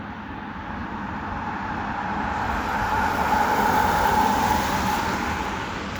Soundscapes > Urban
A car driving by in Hervanta, Tampere. Some wind may be heard in the background. The sound was recorded using a Samsung Galaxy A25 phone